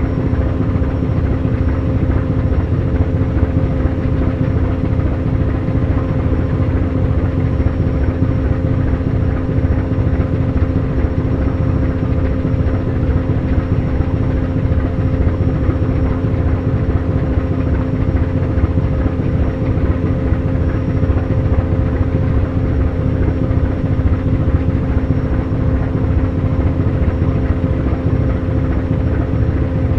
Sound effects > Other mechanisms, engines, machines
250817 090532 PH Ferryboat engine
Ferryboat engine at its cruise speed. Recorded between Calapan city and Batangas city (Philippines), in August 2025, with a Zoom H5studio (built-in XY microphones).
ambience, atmosphere, boat, container-ship, diesel, engine, ferry, ferryboat, field-recording, loud, machine, machinery, mechanism, motor, motorboat, noise, noisy, Philippines, seaman, seamen, ship, tanker